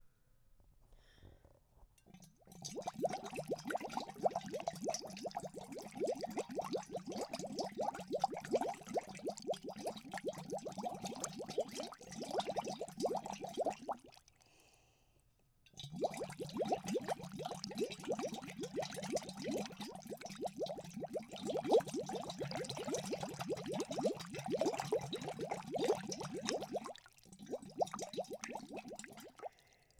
Sound effects > Objects / House appliances
Bubbles in a Metal Tumbler
This is the sound of my partner blowing bubbles into a metal tumbler with a plastic straw. There are also slight breaths in to get more air for blowing more bubbles as well as a breath out at the end. This was recorded with an American D-20 Omnidirectional microphone.
cup, metal, boiling, bubbling, water